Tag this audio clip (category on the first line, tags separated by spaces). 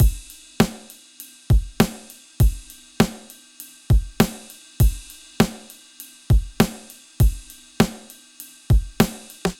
Music > Solo percussion
Vintage Classic Drums 90s Drumloop 80s Drum-Set